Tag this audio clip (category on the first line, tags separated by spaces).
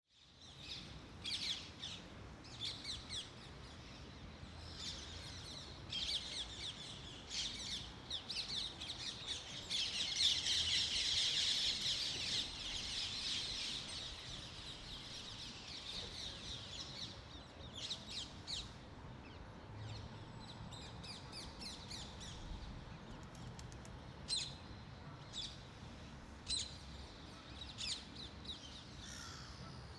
Soundscapes > Urban
3D ambisonics binaural birds spatial